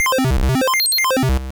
Sound effects > Experimental
square wave octave thing
made by emulating the ay-3-8910 computer chip using a program called "vortex tracker"